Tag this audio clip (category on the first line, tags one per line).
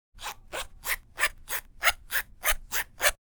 Sound effects > Objects / House appliances
backpack
clothing
trousers
unzip
zip
zipper